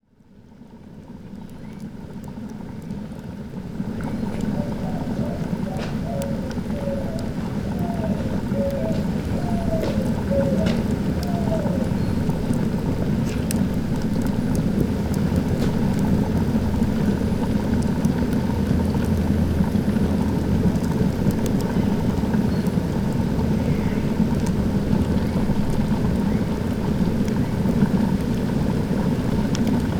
Sound effects > Objects / House appliances
Sopa de frijoles El Salvador
Sound of a pot in a kitchen cooking beans. Captured with a Zoom H5 in Ecoparque El Espino, close to San Salvador, El Salvador.
beans, cooking, el-salvador, field-recording, food, kitchen, san-salvador